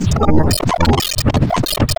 Music > Other
samplebrain glitch loop 120bpm
A glitchy sound loop. Then re-aligned in Audacity.
chaos, chaotic, dirty, electronic, experimental, glitch, noise, sample-brain, samplebrain, smudge